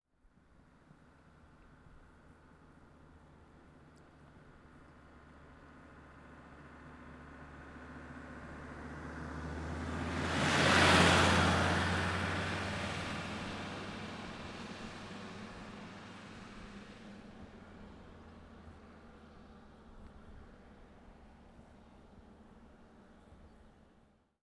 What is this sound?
Soundscapes > Nature
A car passing by on a road at close distance, creating a short doppler effect.
road, vehicle, doppler, traffic, transport, street, passing, car